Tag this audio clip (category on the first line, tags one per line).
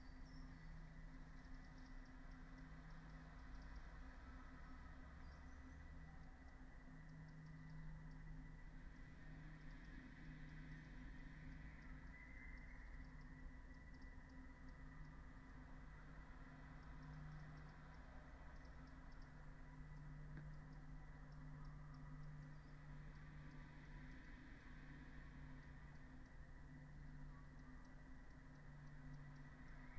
Soundscapes > Nature
modified-soundscape
field-recording
raspberry-pi
nature
weather-data
phenological-recording
alice-holt-forest
sound-installation
Dendrophone
natural-soundscape
soundscape
data-to-sound
artistic-intervention